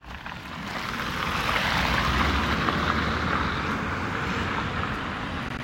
Soundscapes > Urban
Car passing Recording 30
Cars, Road, Transport